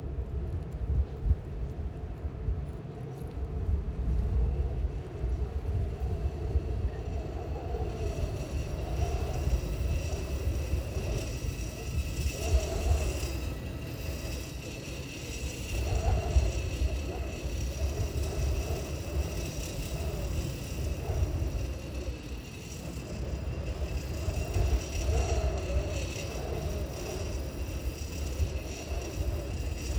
Sound effects > Natural elements and explosions
Wind of Humahuaca / Viento en humahuaca
viento en humahuca, haciendo vibrar los cables de una antena de telecomunicacione. grabado en mono con saken cs3 y sounddevices 744 --------------------------------------------------------------------------------------------------------------------------- Wind in Humahuca, vibrating the cables of a telecommunications antenna. Recorded in mono with Saken CS3 and SoundDevices 744
rustle, argentina, nature, storm, wind, viento, puna, humahuaca, field-recording, jujuy, gusts